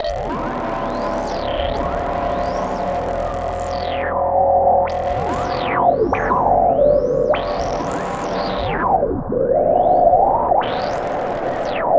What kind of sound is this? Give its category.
Sound effects > Electronic / Design